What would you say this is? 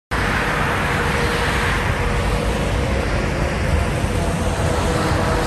Sound effects > Vehicles
Sun Dec 21 2025
car, highway, road